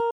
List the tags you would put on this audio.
Instrument samples > String
stratocaster
tone
arpeggio
design
sound
cheap
guitar

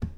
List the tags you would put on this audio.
Sound effects > Objects / House appliances
cleaning
plastic
tip
tool
water

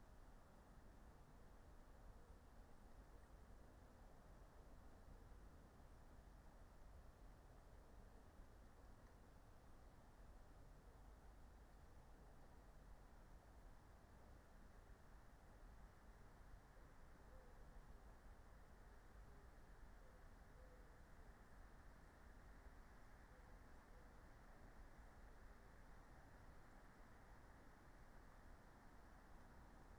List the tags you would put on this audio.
Soundscapes > Nature
modified-soundscape
phenological-recording

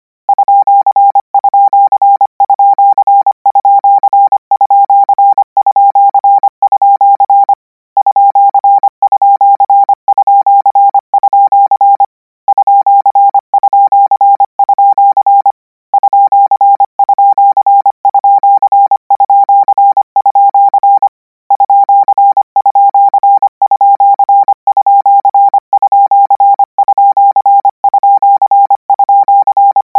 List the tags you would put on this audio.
Sound effects > Electronic / Design
code
codigo
morse
radio
symbols

